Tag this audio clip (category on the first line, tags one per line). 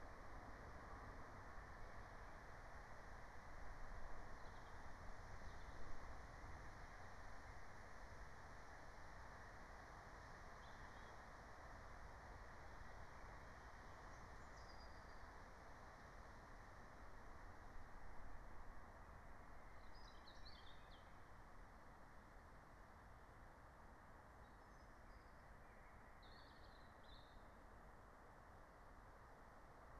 Soundscapes > Nature
natural-soundscape
field-recording
soundscape
meadow
phenological-recording
alice-holt-forest
raspberry-pi
nature